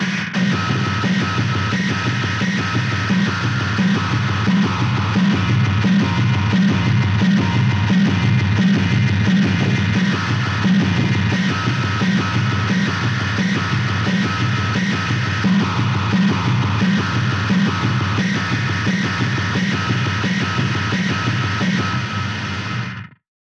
Music > Solo percussion
Simple Bass Drum and Snare Pattern with Weirdness Added 012
Fun, Simple-Drum-Pattern, Bass-and-Snare, FX-Laden, Snare-Drum, FX-Laden-Simple-Drum-Pattern, Bass-Drum, Noisy, Experiments-on-Drum-Patterns, FX-Drum-Pattern, Experiments-on-Drum-Beats, Interesting-Results, FX-Drums, Four-Over-Four-Pattern, Experimental-Production, FX-Drum, Silly, Glitchy, Experimental